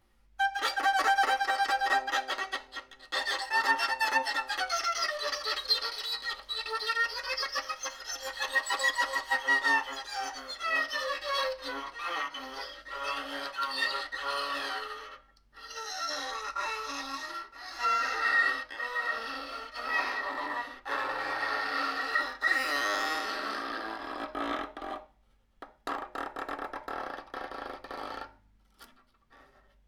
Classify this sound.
Instrument samples > String